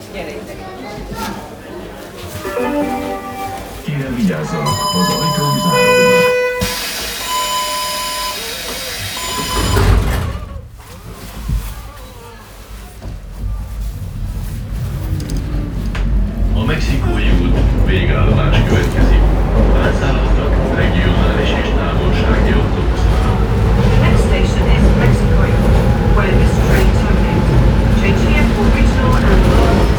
Soundscapes > Urban
Budapest metro M1 historic 2 takeaway on terminal station
Historical subway train in Budapest at terminal station
terminal-station, train, people, platform, traffic, underground, Metro, Budapest, subway